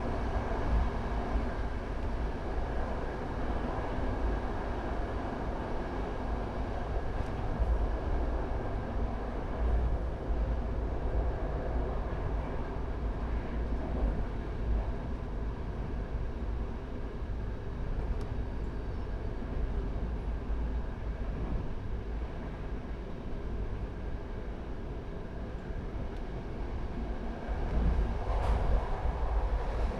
Soundscapes > Indoors
Train by night pt1 & pt2
Recorded on board the night Intercity train that was taking us from Milano Centrale station to Messina. Passengers are asleep in their bunks, the sounds of the train can be heard during a night-time journey. Recorded date: 15/06/2025 at 03:39 - 05:46 with: Zoom H1n with windscreen. Processing: no processing
freesound20, field-recording, night, indoor, ambience, train